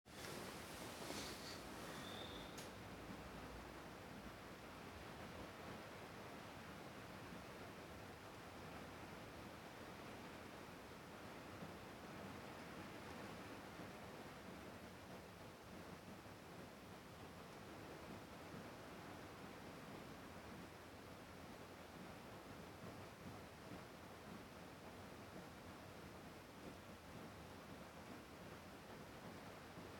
Soundscapes > Indoors
Raining-softly-on-roof-from-inside
This was recorded in my last floor appartment, and it was raining a little on the roof juste above my head. Soft ambiance for the background.
appartment, weather, inside, cozy, ambiance, rain